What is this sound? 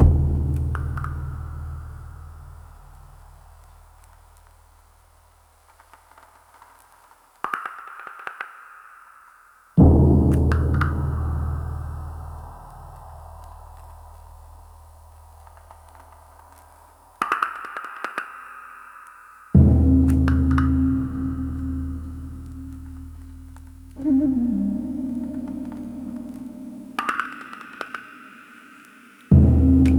Music > Multiple instruments
Tribal Organic Atonal Background Ambient Texture #002
This is part of some experiments I am running to create atonal atmospheric soundscape using AI. This is more tuned towards tribal and organic sounds. AI Software: Suno Prompt: atonal, non-melodic, low tones, reverb, background, ambient, noise, tribal, organic
experimental, ai-generated, pad, atonal, texture, ambient, soundscape, tribal, organic